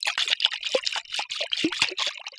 Sound effects > Objects / House appliances
Masonjar Shake 1 Texture
Shaking a 500ml glass mason jar half filled with water, recorded with an AKG C414 XLII microphone.